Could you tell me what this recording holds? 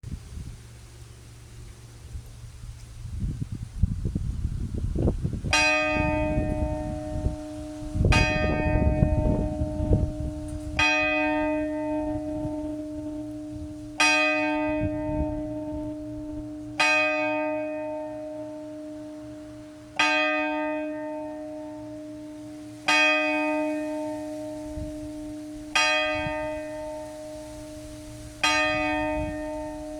Instrument samples > Other
Hilfield Friary Bell (Dorset, England)
This is the Friary bell used to call people to worship during the day. Recorded at Hilfield Friary, Dorset in 2024. Recording made with a Samsung A22 mobile phone.
ringing, ring, dong, ding, church, bell